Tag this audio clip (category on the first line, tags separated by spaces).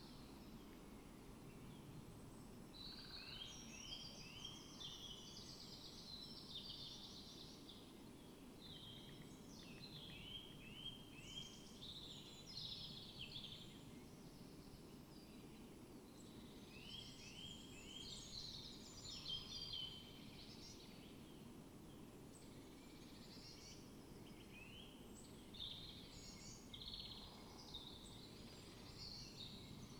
Soundscapes > Nature
alice-holt-forest phenological-recording